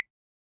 Sound effects > Objects / House appliances
Drop PipetteDripFast 9 Hit
drip, drop, water